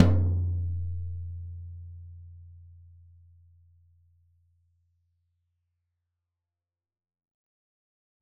Music > Solo percussion
Floor Tom Oneshot -003 - 16 by 16 inch
acoustic, beat, beatloop, beats, drum, drumkit, drums, fill, flam, floortom, instrument, kit, oneshot, perc, percs, percussion, rim, rimshot, roll, studio, tom, tomdrum, toms, velocity